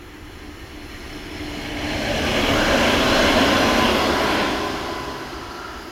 Sound effects > Vehicles
Tram 2025-10-27 klo 20.12.59
Finland, Public-transport, Tram